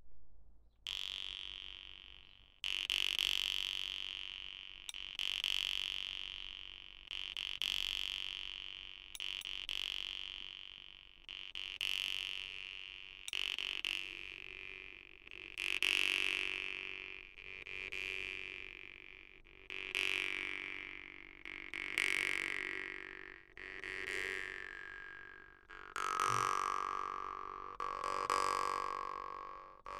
Music > Solo instrument
Vargan solo was recorded on Pixel 6pro
khomus, vargan